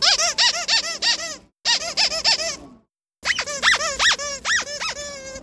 Sound effects > Objects / House appliances
TOYMisc-Samsung Galaxy Smartphone, CU Squeeze, Squeaking 02 Nicholas Judy TDC
A squeeze toy squeaking. Recorded at Lowe's.
cartoon, Phone-recording, squeak, squeeze, toy